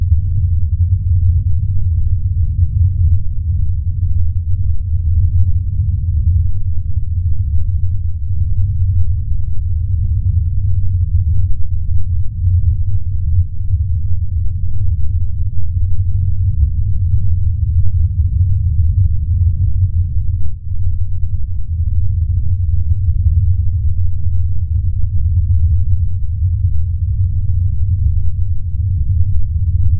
Sound effects > Experimental
"Their inner forum was immense. I remember the winds." For this sound, I recorded ambient noise in my home. And then used Audacity to produce the end product.